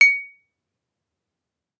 Sound effects > Other mechanisms, engines, machines
Bottle Clink 01
garage, bottle, sample